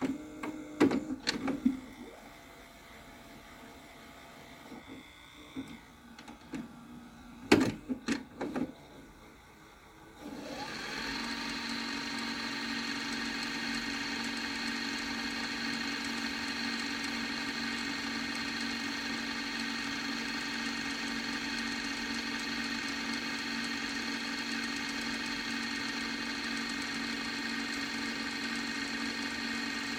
Sound effects > Objects / House appliances
COMAv-Samsung Galaxy Smartphone, MCU VCR, Rewinding VHS Tape, Fast to Slow, Eject, After Video Ends Nicholas Judy TDC
A VCR automatically rewinds a vhs tape from fast to slow after video ends, followed by a VHS tape ejecting.